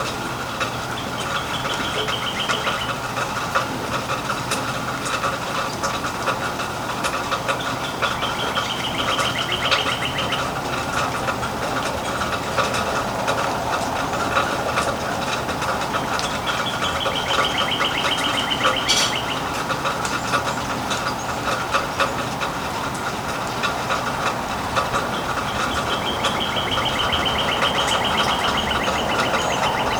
Soundscapes > Nature
Sound of Northern Cardinal calling during rain in Sarasota, Florida. Zoom H4nPro